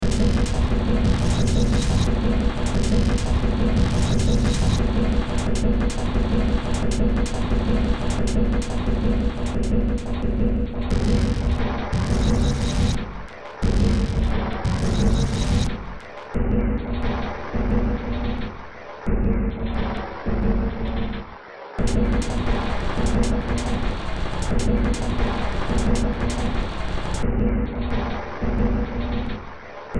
Music > Multiple instruments
Short Track #3574 (Industraumatic)
Noise, Industrial, Sci-fi, Cyberpunk, Underground, Soundtrack, Games, Ambient, Horror